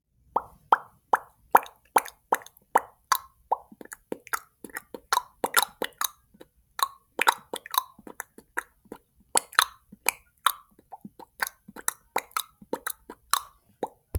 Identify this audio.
Sound effects > Human sounds and actions
Popping Sounds Original
pop, popping, pops, tongue-click